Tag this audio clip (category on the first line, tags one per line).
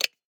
Sound effects > Human sounds and actions
activation
button
interface
switch
toggle